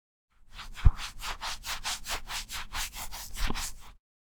Sound effects > Human sounds and actions
scratching a surface
itch, scrape